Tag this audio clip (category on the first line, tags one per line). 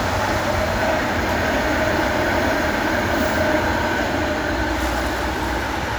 Soundscapes > Urban
Drive-by
Tram